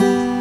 Music > Solo instrument
Acoustic Guitar Oneshot Slice 66
acoustic, pluck, note, foley, plucked, strings, string, notes, fx, sfx, oneshot, guitar, chord, twang, knock